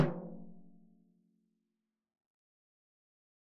Music > Solo percussion
Floor Tom Oneshot -020 - 16 by 16 inch
acoustic, beatloop, drum, drums, fill, flam, floortom, instrument, kit, oneshot, perc, percussion, rim, tom